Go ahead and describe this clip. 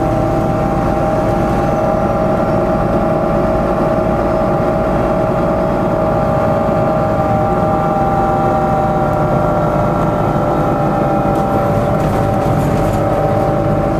Sound effects > Vehicles
Riding the bus
Engine noise inside the bus. This sound was recorded by me using a Zoom H1 portable voice recorder.